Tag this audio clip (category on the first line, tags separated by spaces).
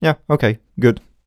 Speech > Solo speech
dialogue good Human Neumann NPC okay relief singletake talk Tascam U67 Vocal voice